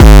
Instrument samples > Synths / Electronic
"UNICO E ORIGINAL" Kick
one-shot, bass-drum, hit, percs, kick-drum, drum, perc, bassdrum, kick, drums, sample, synthesized, oneshot, percussion